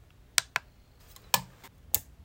Sound effects > Other mechanisms, engines, machines
lightswitch-3sounds
Flipping of light switch -- three different sound tones, effects
field-recording, light, light-switch, on-off, sound-effect, switch, switches